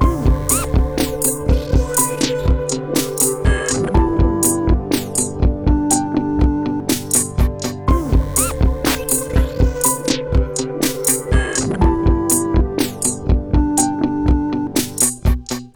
Music > Multiple instruments
Happy Trip Tune Loop 122bpm
A catchy lil trip hop loop I made using FL Studio. Kind of videogame vibes, kind of happy.
bassy, fullmix, beat, keys, perc